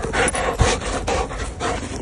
Sound effects > Animals
Dog Panting Loop 2
Dog panting after running back to return a frisbee in a snowy park. Extracted from Malinois Belgian Shepheard recording made with an iPhone 15 Pro, normalized in Audacity and uploaded with permission. Breathing intensity changes, there might be some snow crunch under the dog's feet. The second pump of breath might occur both through mouth and nose.